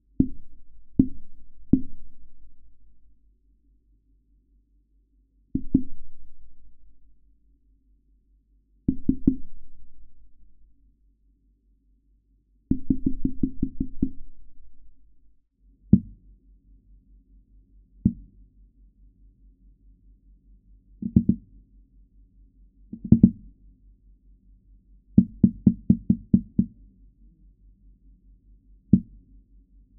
Sound effects > Objects / House appliances
METLTonl GEOFONE Refrigerator Taps with Hum Rmoved
Motor hum removed in iZotope RX
fridge, geofone, hit, hitting, impact, kitchen, metal, refrigerator, sfx, strike, striking, tap, tapping